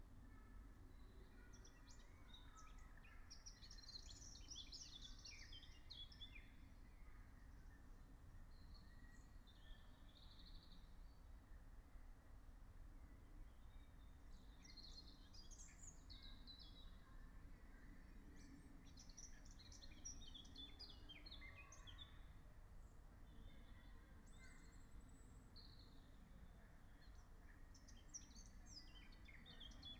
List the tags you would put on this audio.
Soundscapes > Nature
nature phenological-recording alice-holt-forest field-recording raspberry-pi natural-soundscape soundscape meadow

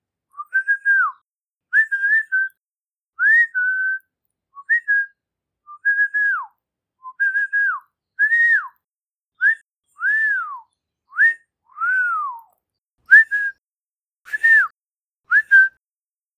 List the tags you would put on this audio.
Sound effects > Human sounds and actions
attention come coming command country harassment here hey human indecent lips male man mouth rustic sexy sheepdog speech stalk stalking texavery uncool voice whistle whistled whistling wolf wolf-whistle Wolf-whistling

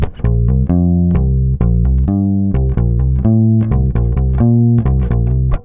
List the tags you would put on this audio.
Instrument samples > String
bass charvel electric loop

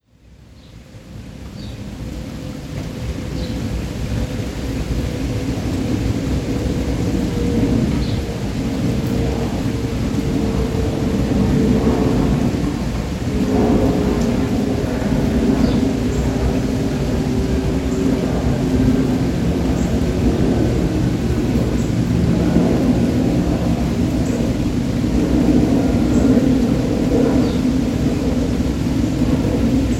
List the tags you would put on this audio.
Sound effects > Vehicles
above airplane birds by distant field-recording flying ground jet pass pass-by passing Phone-recording trees

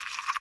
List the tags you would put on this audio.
Sound effects > Other
game interface paper rip scrunch tear ui

